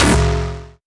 Sound effects > Electronic / Design
Gunshot Digitized Dry
Powerful and instantaneous gunshot from a highly technological weapon. Dry version, as in no reverb.